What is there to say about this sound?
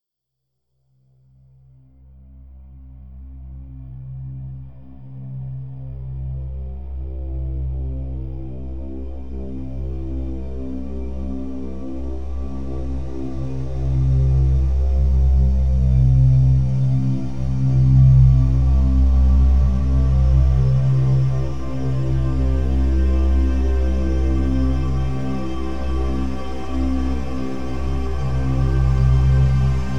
Soundscapes > Synthetic / Artificial
Complex shifting ambient drone 2
Extended ambient soundscape derived from a guitar solo by Sam Thomas. This material is freely offered for any purpose, but a message in the comments about how you made use of it would be of interest.
eerie, ambient